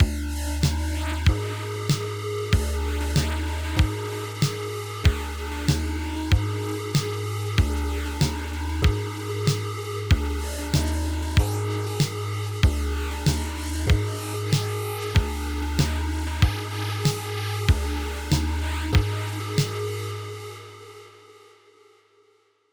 Music > Other
horrible test loop 95 bpm.